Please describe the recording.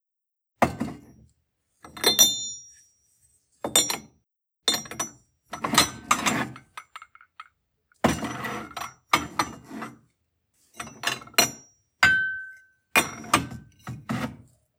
Sound effects > Objects / House appliances
Tableware - Handle plates
Organize the dishes and tidy the dresser. Porcelain clinks. * No background noise. * No reverb nor echo. * Clean sound, close range. Recorded with Iphone or Thomann micro t.bone SC 420.